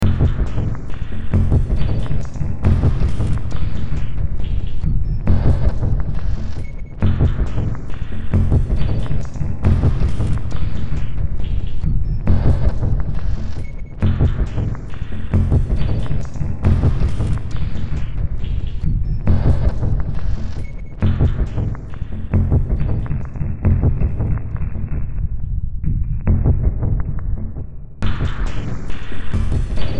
Music > Multiple instruments
Demo Track #3106 (Industraumatic)
Noise, Cyberpunk, Sci-fi, Industrial, Horror